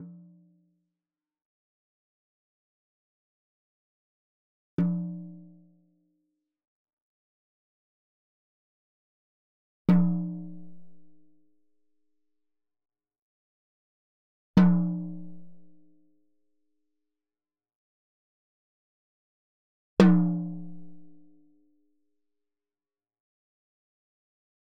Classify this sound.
Music > Solo percussion